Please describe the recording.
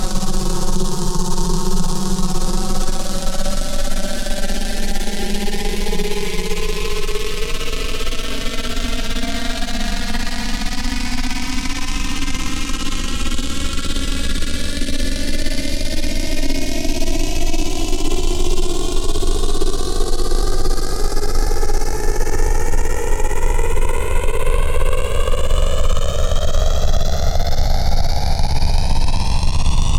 Sound effects > Experimental
"Diving into the center of it all." This sound effect was generated with a software program known as "Vital". Once recorded, I imported the audio file into Audacity where compression effects were applied. The result is what you hear in the upload.